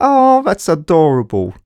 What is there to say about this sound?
Speech > Solo speech
Affectionate Reactions - Awwn thats adorable
affectionate, Human, Single-take, Tascam, U67